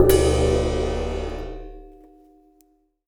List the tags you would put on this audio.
Music > Solo instrument
Oneshot
Custom
Drum
Ride
Kit
Cymbals
Perc
22inch
Cymbal
Percussion
Paiste
Metal
Drums

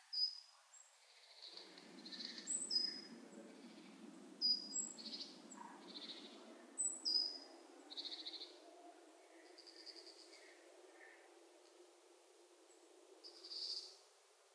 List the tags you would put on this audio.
Sound effects > Animals
birds
forest

